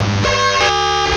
Sound effects > Electronic / Design
RGS-Glitch OneShot 2.5
Randomly modulated with phaseplant only. Processed with OTT, ZL EQ.